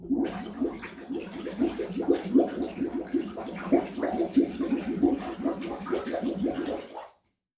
Sound effects > Natural elements and explosions
WATRBubl-Samsung Galaxy Smartphone, CU Bathtub Bubbles Nicholas Judy TDC
Bubbling in a bathtub.
bathtub, bubbles, Phone-recording, water